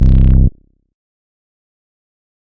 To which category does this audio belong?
Instrument samples > Synths / Electronic